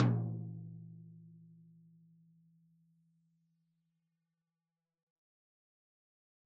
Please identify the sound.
Music > Solo percussion
Med-low Tom - Oneshot 15 12 inch Sonor Force 3007 Maple Rack
med-tom, recording, acoustic, real, drums, roll, kit, realdrum, maple, Tom, percussion, perc, flam, oneshot, beat, drum, toms, loop, tomdrum